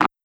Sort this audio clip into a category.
Sound effects > Human sounds and actions